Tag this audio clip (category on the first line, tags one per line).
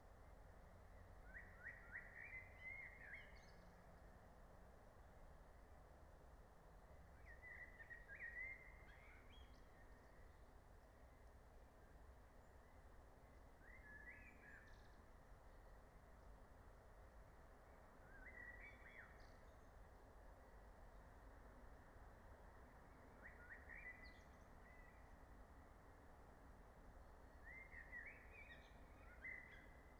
Soundscapes > Nature
alice-holt-forest
nature
field-recording
meadow
phenological-recording
raspberry-pi
soundscape
natural-soundscape